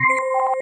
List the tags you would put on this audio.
Sound effects > Electronic / Design
confirmation
alert
massage
interface
selection
digital

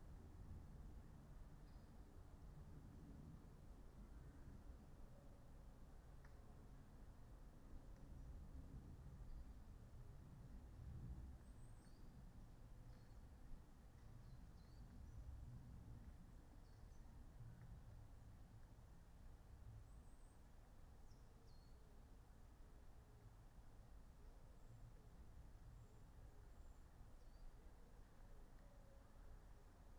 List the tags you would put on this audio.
Soundscapes > Nature
raspberry-pi
data-to-sound
phenological-recording
sound-installation
modified-soundscape
soundscape
alice-holt-forest
natural-soundscape
artistic-intervention
nature
field-recording
Dendrophone
weather-data

